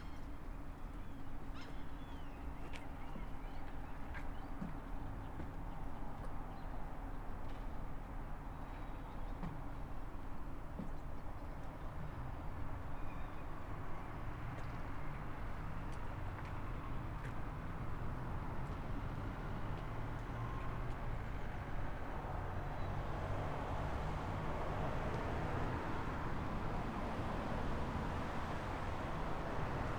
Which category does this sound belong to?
Soundscapes > Urban